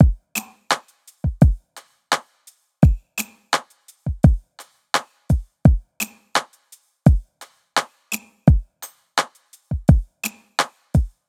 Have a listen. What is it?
Instrument samples > Percussion
lazy reverby drum loop (85bpm)
sample, loop, reverb, percs, drum, drums